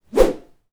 Sound effects > Natural elements and explosions
Stick - Whoosh 9 (Airy)
airy, FR-AV2, NT5, Rode, stick